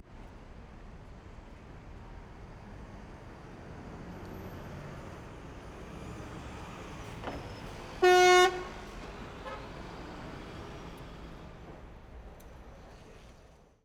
Soundscapes > Urban
Large Bus, FX, Busy Street, Double Decker, 2OA - Spatial Audio
A London double decker passing through. A great recording with loads of low end. Recorded with Reynolds 2nd Order Ambisonics microphone, the audio file has 9 tracks, already encoded into B-Format Ambisonics. Can be encoded into binaural format.
Ambience, AmbiX, Binaural, Bus, Double-Decker, Field-recording, Fx, Immersive, Immersive-Audio, Large-Bus, London, London-Bus, Mechanics, O2A, Shop, Sound-Effect, Spatial, Spatial-Audio, Travel